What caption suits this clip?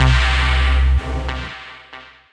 Instrument samples > Synths / Electronic
CVLT BASS 135
synth, lowend, sub, lfo, stabs, subwoofer, low, subbass, drops, bass, wavetable, subs, wobble, synthbass, bassdrop, clear